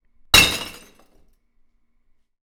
Sound effects > Objects / House appliances
A glass bottle being thrown into a recycling bin in the recycling room. Recorded with a Zoom H1.